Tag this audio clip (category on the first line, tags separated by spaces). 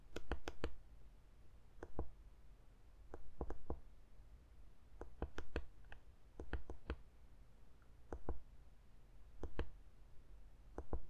Sound effects > Objects / House appliances
Button thock DSi ds nintendo Dpad